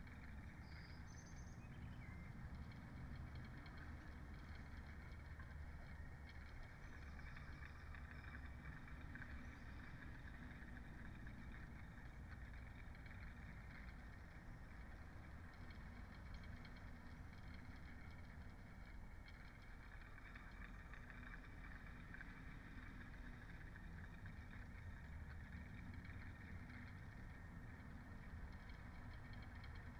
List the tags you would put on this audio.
Soundscapes > Nature
modified-soundscape
sound-installation
Dendrophone
data-to-sound
natural-soundscape

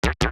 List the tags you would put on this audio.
Sound effects > Electronic / Design
BOOP
DING
HARSH
OBSCURE
INNOVATIVE
SYNTHETIC
HIT
ELECTRONIC
EXPERIMENTAL
COMPUTER
UNIQUE
CHIPPY
CIRCUIT
SHARP
BEEP

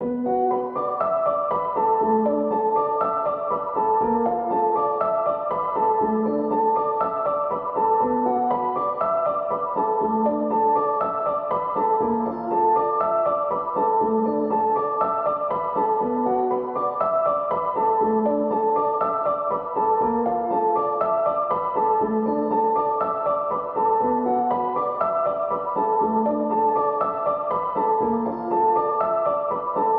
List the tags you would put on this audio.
Music > Solo instrument
piano
pianomusic
reverb
simplesamples
120
simple
samples
120bpm
free
music
loop

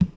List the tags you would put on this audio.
Sound effects > Objects / House appliances
water
foley
lid
fill
slam
drop
kitchen
container
bucket
scoop
tip
liquid
shake
pour
cleaning
carry
spill
hollow
tool
clatter
metal
pail
debris
household
knock
clang
object
garden
plastic
handle

